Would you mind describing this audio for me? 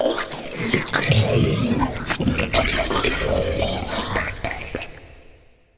Sound effects > Experimental
Creature Monster Alien Vocal FX (part 2)-008
A collection of alien creature monster sounds made from my voice and some effects processing